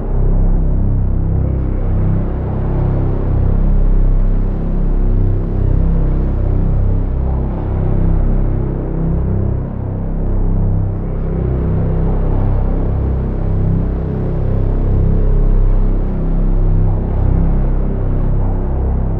Music > Solo instrument
Dark Tension Drone
A dark, intense and suspenseful drone with creepy background noises. Made with Serum 2 and personal samples